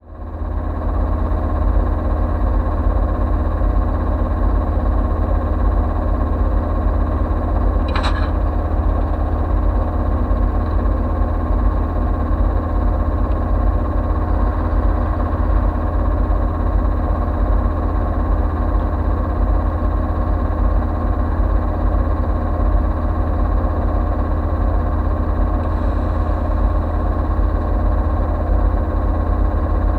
Sound effects > Other mechanisms, engines, machines
A recording of the inside of a train from a recent trip to London using a contact mic.
contact, field, mic, recording, rumble, train